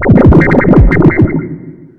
Instrument samples > Synths / Electronic
Benjolon 1 shot39
1SHOT, BENJOLIN, CHIRP, DRUM, MODULAR, NOSIE, SYNTH